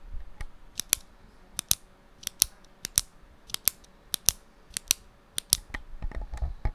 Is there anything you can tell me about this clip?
Sound effects > Objects / House appliances
Pen Clicking
Clicking a pen
Click, Pen, Object